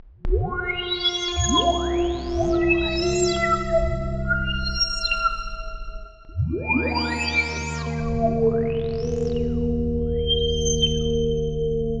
Soundscapes > Synthetic / Artificial
PPG Wave 2.2 Boiling and Whistling Sci-Fi Pads 16
science-fiction, horror, scifi, dark-soundscapes, content-creator, PPG-Wave, cinematic, dark-techno, noise-ambient, sound-design, noise, sci-fi, vst, mystery, dark-design, drowning